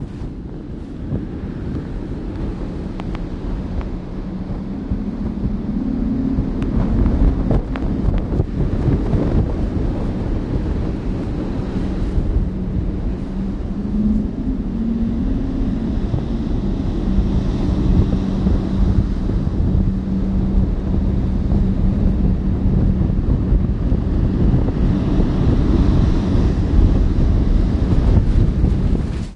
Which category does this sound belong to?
Sound effects > Natural elements and explosions